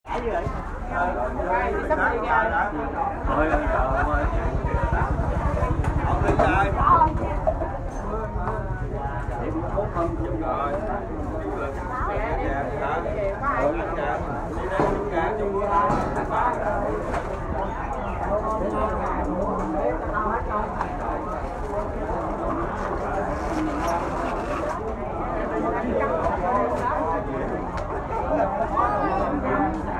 Soundscapes > Other
Sound environment in 'đám giỗ' party. Many people talk. Record use iPhone 7 Plus smart phone 2025.07.04 10:29